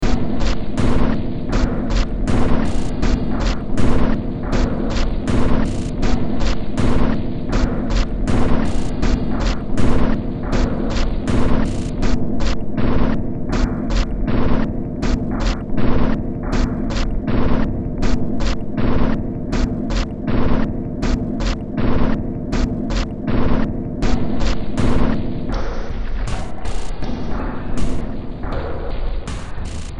Music > Multiple instruments
Demo Track #3778 (Industraumatic)
Horror, Games, Underground, Noise, Sci-fi, Soundtrack, Cyberpunk, Ambient, Industrial